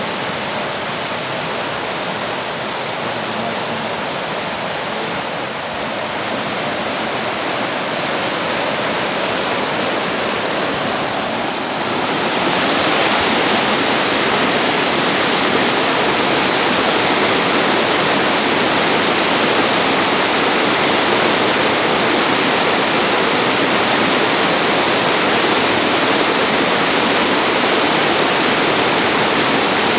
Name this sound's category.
Soundscapes > Nature